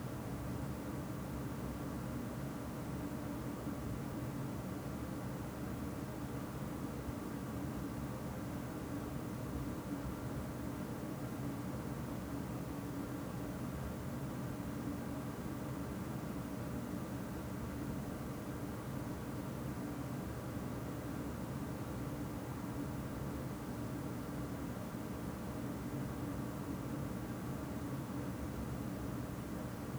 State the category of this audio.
Soundscapes > Indoors